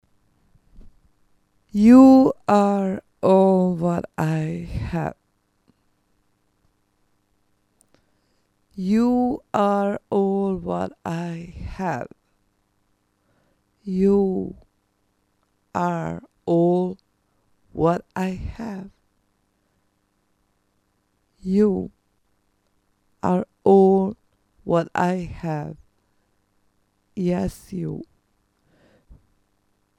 Speech > Solo speech
Vocal - I Thank You For Being You
For free. Thank you very much for YOUR attention.
american, english, female, speak, talk, vocal, voice